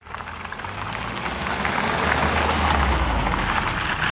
Sound effects > Vehicles
a combustionengine car driving by
car, combustionengine, driving